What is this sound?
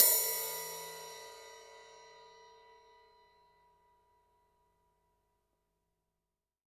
Music > Solo instrument
Cymbal hit with knife-010
Crash, Kit, Metal, Ride, Percussion, Cymbal, Drum, Drums, Custom, Sabian, FX, Oneshot, Perc, GONG, Hat, Paiste, Cymbals